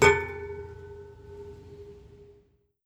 Sound effects > Objects / House appliances
A metal hit and ring.